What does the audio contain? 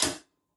Sound effects > Other mechanisms, engines, machines
Industrial light switch
Recorded from a circuit breaker and my office
Industrial, press, switch